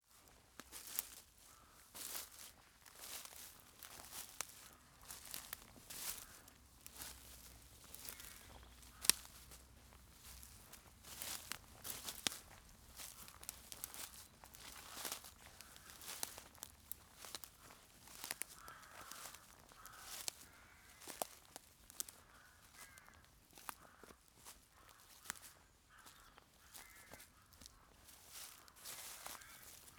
Soundscapes > Nature
A recording of me walking over twigs, leaves and branches outdoors.